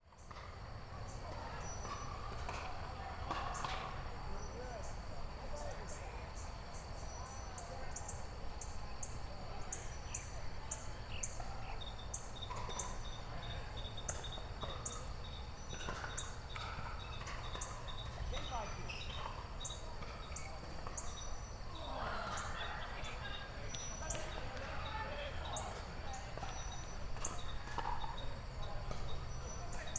Soundscapes > Urban
PickelballSummerDay September 1 2025 mixdown
An enjoyable soundscape of the unique sounds of pickleball being played at a park in a small, southern Illinois town. Recorded on Monday September 1 2025. Equipment: Recorder Sound Devices Mixpre 3 ii Mics- Sennheiser MKH 8020 Sennheiser MKH 416
Athletic, Community, Neighborhood, Pickleball